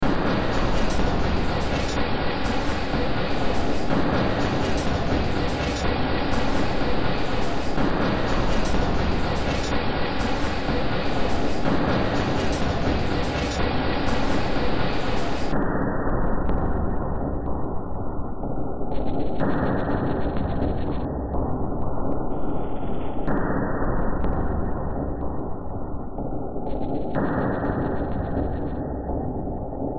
Music > Multiple instruments
Demo Track #3747 (Industraumatic)
Ambient, Cyberpunk, Games, Horror, Industrial, Noise, Sci-fi, Soundtrack, Underground